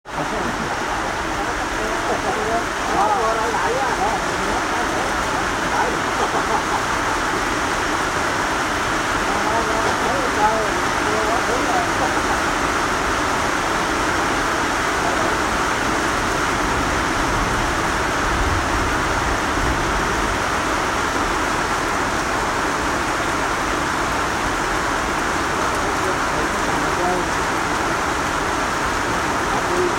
Soundscapes > Nature
Nói Chuyện Và Mưa - Rain And Talk
Rain, talk, and motorcycle sound. Record use iPhone 7 Plus smart phone. 2025.09.13 15:53
nature, rain, weather, talk